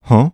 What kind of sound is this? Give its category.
Speech > Solo speech